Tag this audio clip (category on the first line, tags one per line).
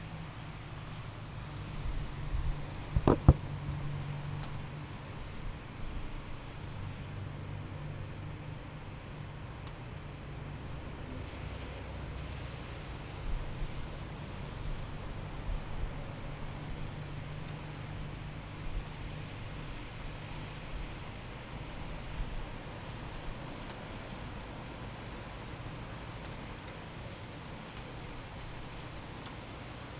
Soundscapes > Urban
noise
city
traffic
ambience
hum
wet